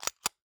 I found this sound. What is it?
Sound effects > Other
Pistol Cock 1
The slide of a pistol being cocked quickly once. Recorded 1/1/26 with a Zoom H4Essential.